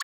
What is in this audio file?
Sound effects > Electronic / Design
A select sound you could use for a game GUI or something. I made this for a game a while ago.